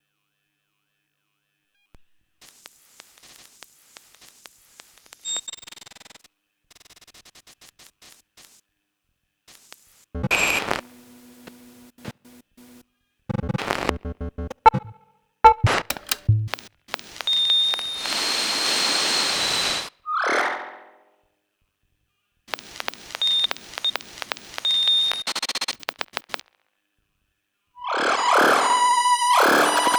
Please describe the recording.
Sound effects > Electronic / Design
Konkret Jungle 8
From a pack of samples focusing on ‘concrete’ and acousmatic technique (tape manipulation, synthetic processing of natural sounds, extension of “traditional” instruments’ timbral range via electronics). This excerpt is one of the weirder melanges in this series - taking digital splices of door creaking, the opening-closing of a MiniDisc player, and other random bumps and thuds, alternating with the LFO-induced birdcalls from a MakeNoise Spectraphon module.
MiniDisc, acousmatic, slicing